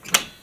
Sound effects > Objects / House appliances
door sauna open1
Sauna door being opened. Recorded with my phone.
sauna, opening, wooden, door, open